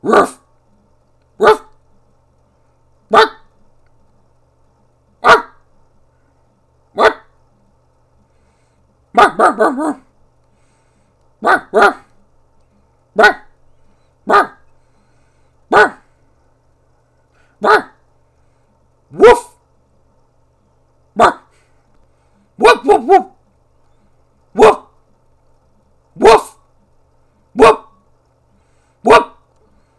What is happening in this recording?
Sound effects > Animals
TOONAnml-Blue Snowball Microphone, CU Dog Barking, Human Imitation, Cartoon Nicholas Judy TDC
A dog barking. Human imitation. Cartoon.